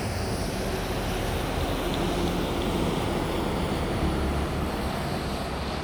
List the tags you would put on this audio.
Sound effects > Vehicles
engine
vehicle
bus

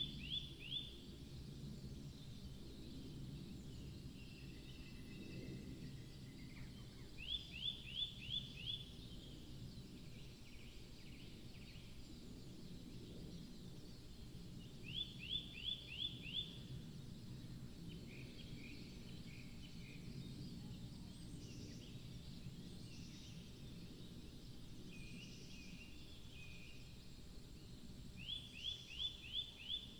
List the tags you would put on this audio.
Soundscapes > Nature
sound-installation
data-to-sound
phenological-recording
Dendrophone
weather-data
alice-holt-forest
natural-soundscape
field-recording
raspberry-pi
soundscape
modified-soundscape
artistic-intervention
nature

